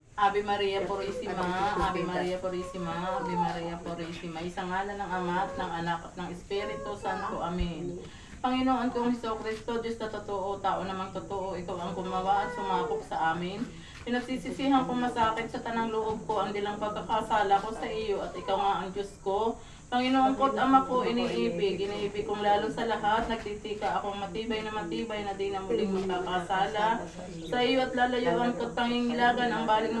Speech > Other
250815 091252 PH Filipino ladies praying for ancestors
Filipino ladies praying for ancestors. I made this recording in a cemetery of Tingloy island (Batangas, Philippines) while ladies were praying for their ancestors. In the background, cicadas and noises from the surroundings. Many thanks to them for letting me record such an important worship ! Recorded in August 2025 with a Zoom H5studio (built-in XY microphones). Fade in/out applied in Audacity.
Tagalog, child, ladies, Tingloy, praying